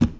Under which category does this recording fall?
Sound effects > Objects / House appliances